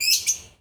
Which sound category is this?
Sound effects > Animals